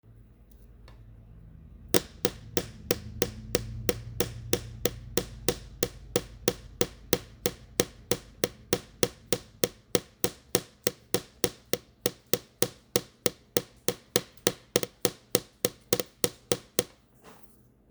Sound effects > Objects / House appliances
Pen tapping 2
pen tapping on a desk
tapping, pen, clicking, desk